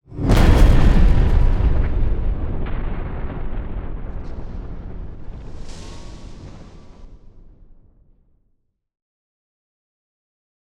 Sound effects > Other
Sound Design Elements Impact SFX PS 047
sfx, force, cinematic, smash, percussive, impact, strike, hard, thudbang, rumble, collision, power, hit, crash, design, blunt, game, transient, effects, explosion, heavy, shockwave, sharp, audio, sound